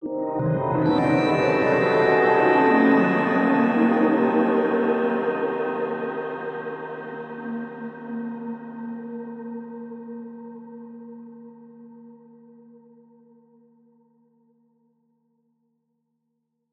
Sound effects > Electronic / Design
a magical spell type bursting pad sound created using Phase Plant and processed in FL Studio. good for a videogame, theater, or cinema. whooshing type electronic flutters